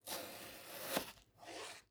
Sound effects > Other
Long slice vegetable 5
Indoor, Vegetable, Cut, Cook, Chef, Slice, Kitchen, Knife, Cooking, Chief, Home